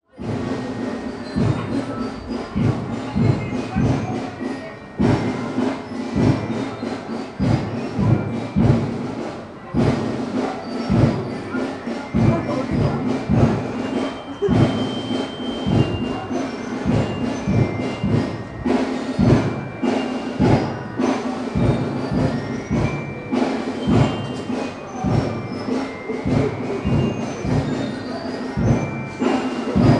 Music > Multiple instruments
Banda escolar Valparaiso
Ambient sound of a distant school band recorded in the Puerto neighborhood of Valparaiso, Chile.
Chile, field, school, band, street, america, valparaiso, recording, south